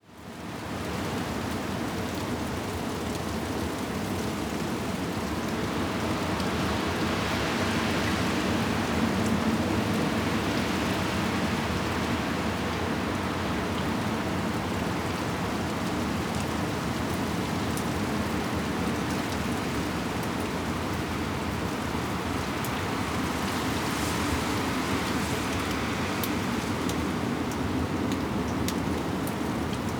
Soundscapes > Nature
AMB RAIN WIND BAD WEATHER kengwai cct
Bad weather recorded on my doorstep (21/01/21 at 22h55). Recorded by two LOM MicroUsi and a Sound Devices Mixpre6
SOUNDSCAPE, WEATHER, RAIN, AMBIENT, FIELD, WIND